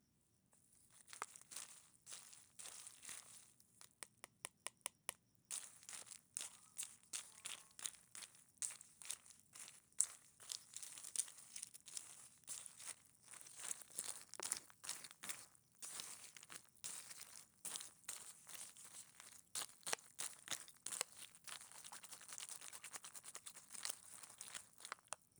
Sound effects > Human sounds and actions
sinking some cereal in the milk with a wood spoon